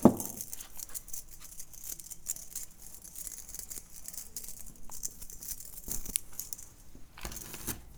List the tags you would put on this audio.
Sound effects > Other mechanisms, engines, machines
fx Brush Woodshop Scrape Bristle Workshop Mechanical Tools Tool Foley Metallic Shop sfx Household Brushing

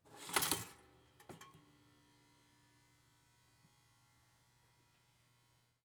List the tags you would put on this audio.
Sound effects > Objects / House appliances
appliances household toast toaster

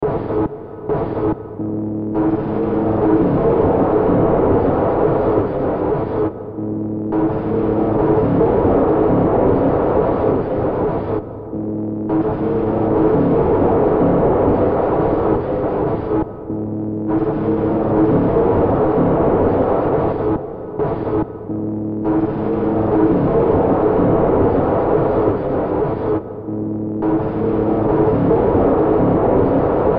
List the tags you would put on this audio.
Soundscapes > Synthetic / Artificial
Ambience Hill Underground Soundtrack

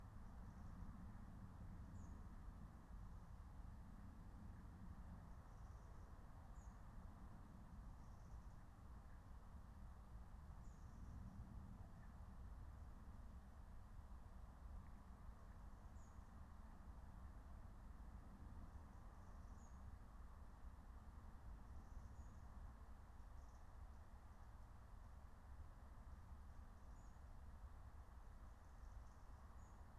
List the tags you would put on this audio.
Soundscapes > Nature
alice-holt-forest nature raspberry-pi

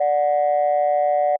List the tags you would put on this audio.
Instrument samples > Synths / Electronic
Holding-Tone JI JI-3rd JI-Third just-minor-3rd just-minor-third Landline Landline-Holding-Tone Landline-Phone Landline-Phonelike-Synth Landline-Telephone Landline-Telephone-like-Sound Old-School-Telephone Synth Tone-Plus-386c

